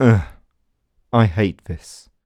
Speech > Solo speech
Displeasure - Urgh I hate this
FR-AV2, oneshot, U67